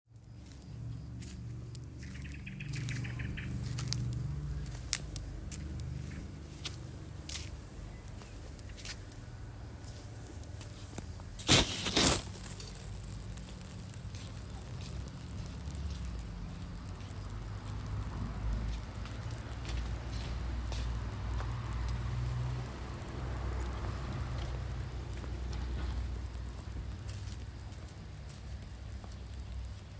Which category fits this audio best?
Sound effects > Natural elements and explosions